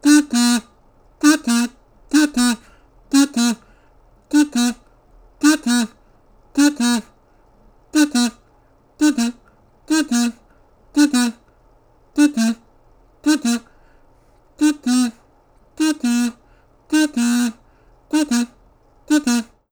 Music > Solo instrument
MUSCInst-Blue Snowball Microphone, CU Kazoo, 'Cuckoo' Imitation Nicholas Judy TDC
A kazoo 'cuckoo' imitation.
imitation, kazoo, Blue-Snowball, Blue-brand, cartoon, cuckoo